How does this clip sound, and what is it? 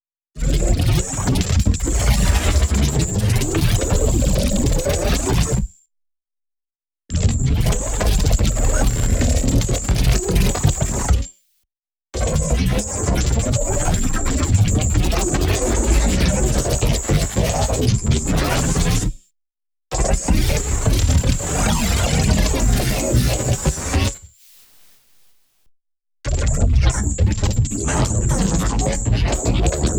Sound effects > Experimental
SFX Liquid SciFi Rumble Warp Spaceship Alien
Sound Designed with Phase Plant
Alien, Outer-Space, Space, Rumble, Game-Creation, Sci-Fi, Spaceship, UFO, SciFi